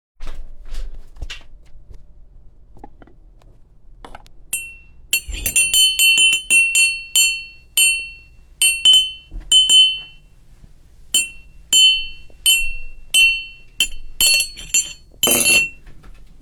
Sound effects > Objects / House appliances
Metal spoon hitting ceramic mug